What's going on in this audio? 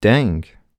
Speech > Solo speech
Sadness - Daang
talk, U67, Sadness, FR-AV2, singletake, Dang, Single-take, sad, Vocal, oneshot, voice, Male, Voice-acting, Human, Tascam, dialogue, Neumann, Mid-20s, Video-game, word, NPC, Man